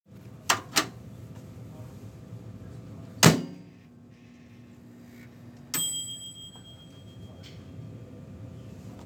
Sound effects > Objects / House appliances
microwave sounds
Recorded at work using iPhone voice recorder and processed in Reaper.
bell, microwave, appliance, buttons, closing